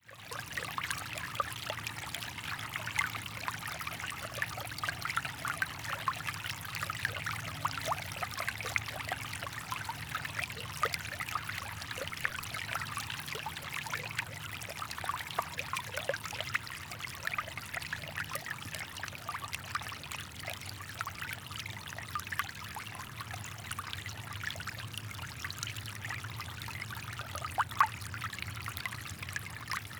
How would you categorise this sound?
Soundscapes > Nature